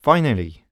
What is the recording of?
Speech > Solo speech
Relief - Finally firm
dialogue, finally, FR-AV2, Male, Neumann, oneshot, singletake, Tascam, U67, Vocal, voice, Voice-acting